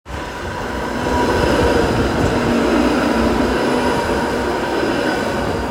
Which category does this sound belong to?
Sound effects > Vehicles